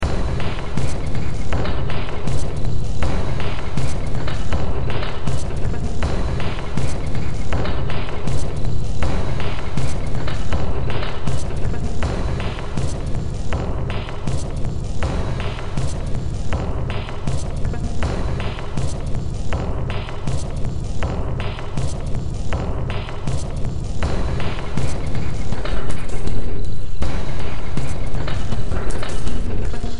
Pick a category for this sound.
Music > Multiple instruments